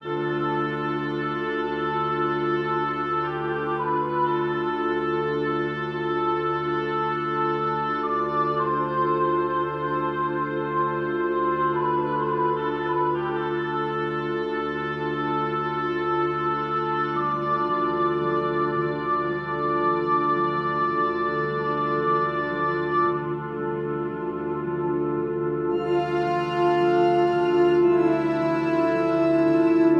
Music > Multiple instruments
Composed using instruments from BBC Symphony Orchestra in FL Studio - this piece conveys a sense of ceremony on the Scottish Highlands.